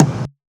Instrument samples > Percussion
Tap Kick 3
Samples recorded during my time as a cashier summer 2017 newly mixed and mastered for all your audio needs.
kick, vintage, lofi, drums, kickdrum, tap, drumkick